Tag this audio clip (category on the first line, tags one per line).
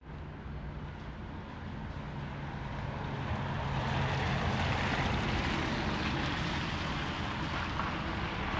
Sound effects > Vehicles
driving
combustionengine